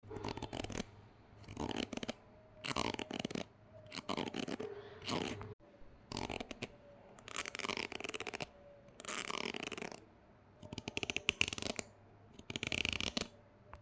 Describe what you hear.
Sound effects > Objects / House appliances
Eating Hard Crunchy Object SoundEffect
A sharp, loud, and distinctive crunchy sound effect of a hard object being consumed (eaten). Ideal for use in animations, video games (especially for character actions like eating strange or durable food items, or breaking a shell/candy), films, and cartoon projects. The sound has a pronounced snap and a sustained crunch texture, suggesting a solid, non-soft edible item.
biting, consume, mouth